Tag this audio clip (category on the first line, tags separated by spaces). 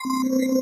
Sound effects > Electronic / Design
interface digital confirmation message alert selection